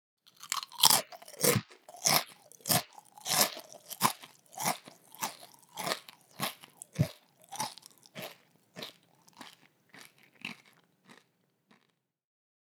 Sound effects > Other
FOODEat Cinematis RandomFoleyVol2 CrunchyBites HummusChipsBite OpenMouth NormalChew 02 Freebie
bag, bite, bites, chips, crunch, crunchy, design, effects, foley, food, handling, hummus, plastic, postproduction, recording, rustle, SFX, snack, sound, texture